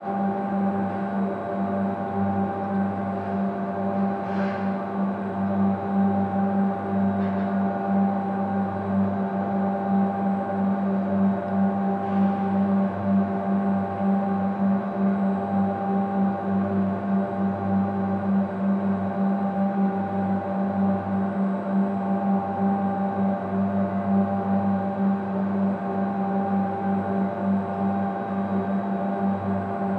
Soundscapes > Urban
Splott - Water Tower Electrical Hum 01 (Tuned to G) - Splott Beach Costal Path
splott, fieldrecording, wales